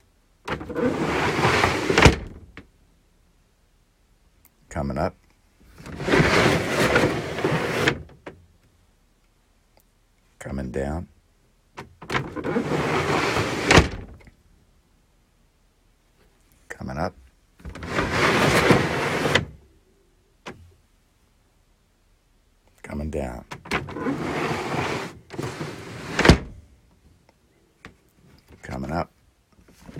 Sound effects > Objects / House appliances
Roll top rolltop desk
a rolltop desk being opened and closed
desk, wood